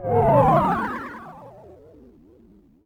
Sound effects > Experimental
Analog Bass, Sweeps, and FX-142
synth, korg, bass, sample, basses, effect, sfx, dark, electronic, sci-fi, trippy, electro, snythesizer, alien, weird, sweep, vintage, robot, fx, complex, robotic, mechanical, analog, oneshot, bassy, scifi, pad, analogue, machine, retro